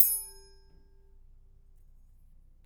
Sound effects > Objects / House appliances
knife and metal beam vibrations clicks dings and sfx-090
Beam, Clang, ding, Foley, FX, Klang, Metal, metallic, Perc, SFX, ting, Trippy, Vibrate, Vibration, Wobble